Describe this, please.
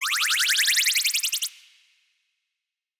Sound effects > Experimental
kawaii short rizer BPM160
Made by LMMS (sfxr)
chiptunes, rizer, kawaii